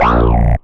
Instrument samples > Synths / Electronic
CVLT BASS 65
wavetable, drops, stabs, lfo, bassdrop, wobble